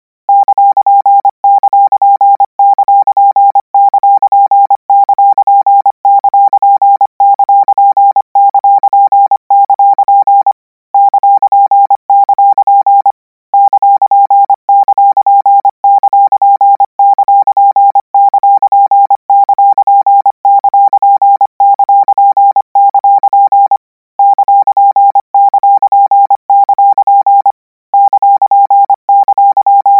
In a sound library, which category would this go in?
Sound effects > Electronic / Design